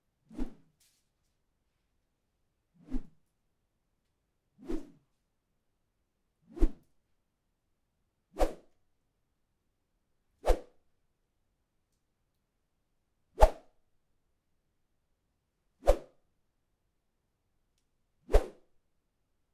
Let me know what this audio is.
Sound effects > Natural elements and explosions
Subject : A whoosh sound made by swinging a stick. Recorded with the mic facing up, and swinging above it. Date YMD : 2025 04 21 Location : Gergueil France. Hardware : Tascam FR-AV2, Rode NT5. Weather : Processing : Trimmed and Normalized in Audacity. Fade in/out.